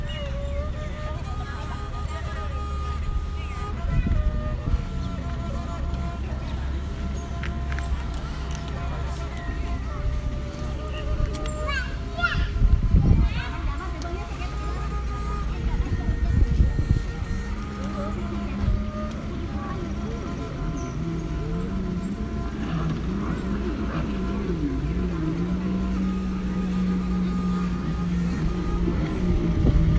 Soundscapes > Nature
Music performance, Kanchanaburi, Thailand (Feb 24, 2019)
Field recording of music performed by local musician in Kanchanaburi, Thailand. Features the string instrument and urban atmosphere.
ambient, Kanchanaburi, peaceful, Thailand